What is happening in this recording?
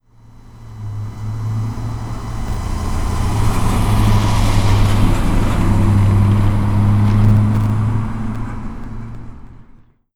Sound effects > Vehicles
VEHBy-Tascam DR05 Recorder, CU Truck, Delivery, Pass By Nicholas Judy TDC
A delivery truck passing by.
truck, delivery, Tascam-DR05, pass-by, Tascam-DR-05, Tascam-brand